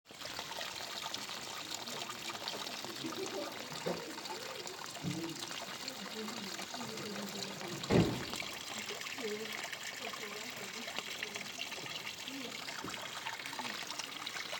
Soundscapes > Nature
7 févr., 10.29 ruissellement égout
rain, weather